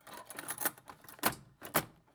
Sound effects > Objects / House appliances
forks handling5
cutlery, forks